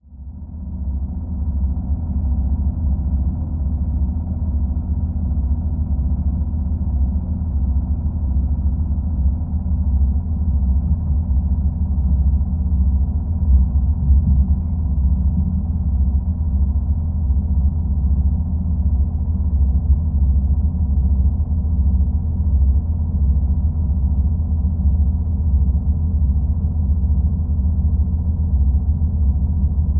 Sound effects > Other mechanisms, engines, machines
A recording of the inside of a train from a recent trip to London using a contact mic.
contact, field, mic, recording, rumble, train